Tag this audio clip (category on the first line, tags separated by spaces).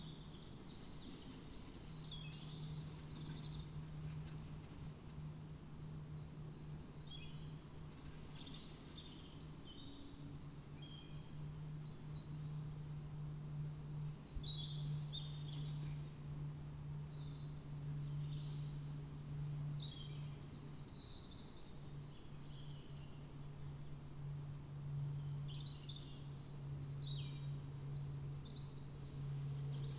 Soundscapes > Nature
sound-installation field-recording raspberry-pi